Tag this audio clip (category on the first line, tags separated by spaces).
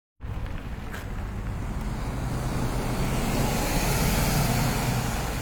Sound effects > Vehicles
bus bus-stop Passing